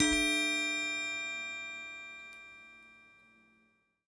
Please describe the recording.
Instrument samples > Other
Baoding Balls - High - 04 (Short)
baoding, closerecording